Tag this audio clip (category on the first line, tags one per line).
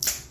Sound effects > Human sounds and actions
foley
neck
Phone-recording
snap